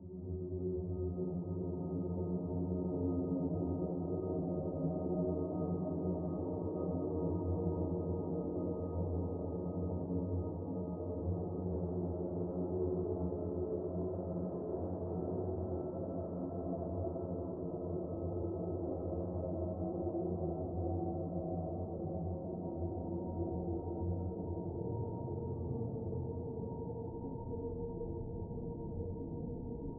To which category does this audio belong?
Soundscapes > Synthetic / Artificial